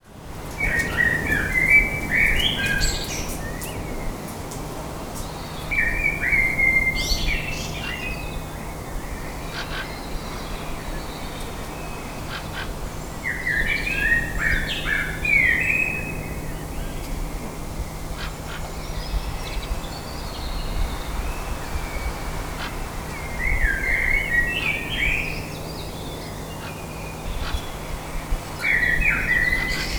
Soundscapes > Nature
Blackbirds and birds after a thunderstorm. You can use the recording for any purpose. It would be nice if you could send me the result if you use it, but it's not necessary. So enjoy the birds - and have a great day!